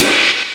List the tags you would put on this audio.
Instrument samples > Percussion
20-inch bass-splash crash cymbal cymbals drums DW low-pitched Meinl Paiste Sabian Zildjian Zultan

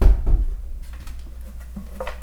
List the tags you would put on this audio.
Sound effects > Objects / House appliances
stab bonk sfx metal hit oneshot glass perc fx foley industrial drill object foundobject fieldrecording clunk mechanical percussion natural

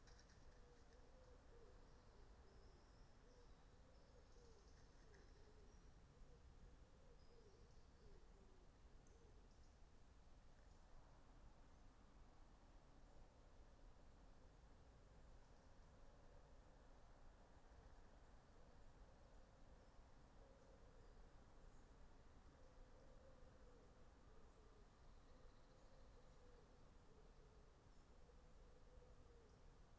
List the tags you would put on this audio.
Soundscapes > Nature
alice-holt-forest nature raspberry-pi field-recording Dendrophone modified-soundscape weather-data sound-installation data-to-sound phenological-recording soundscape artistic-intervention natural-soundscape

